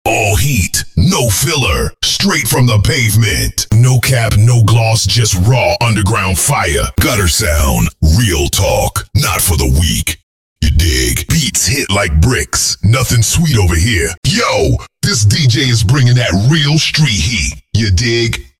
Speech > Solo speech
Hip Hop Style DJ Drops
Here is free dj drops with a Rap, Hip Hop and urban attitude for all djs to use on their next dj set or gig.